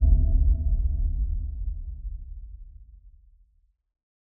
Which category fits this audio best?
Sound effects > Electronic / Design